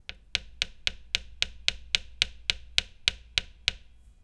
Sound effects > Objects / House appliances
Tic Tic by Hitting the Guitar Body with the Guitar Pick Position 1
The tic tic sound generated by hitting the guitar body with a pick Recorded with Zoom Essential h1n
field-recording, guitar, pick, tac, tic, tic-tic, wood